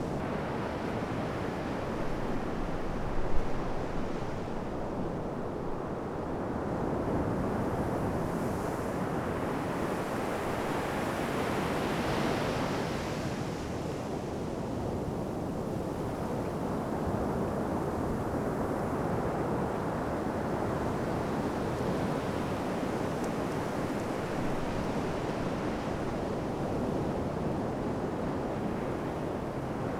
Soundscapes > Nature
WATRSurf-Gulf of Mexico Strong Surf, Breaking Waves, Shoreline 630AM QCF Gulf Shores Alabama Zoom H3VR
Early Morning waves, strong surf, Gulf of Mexico, Gulf Shores, Alabama. Surf at a distance of 25 yards away.